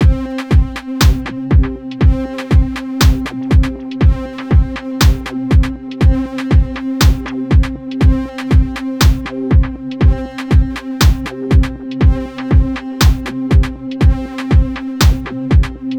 Music > Multiple instruments
Industrial Strong Drum Loop 120 bpm
Industrial loop created with Elektron Digitakt 2 and the Audioworks Biomorph sound pack